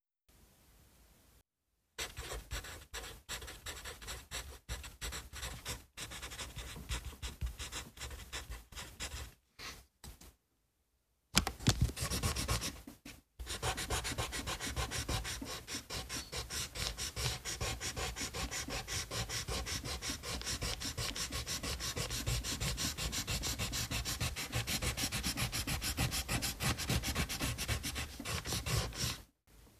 Sound effects > Objects / House appliances
Erasing a section of words